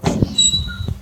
Sound effects > Objects / House appliances
Metal hatch opening. Recorded with my phone.